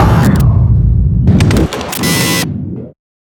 Sound effects > Other mechanisms, engines, machines
Sound Design Elements-Robot mechanism-010
actuators, automation, circuitry, clanking, clicking, design, digital, elements, feedback, gears, grinding, hydraulics, machine, mechanical, mechanism, metallic, motors, movement, operation, powerenergy, processing, robot, robotic, servos, sound, synthetic, whirring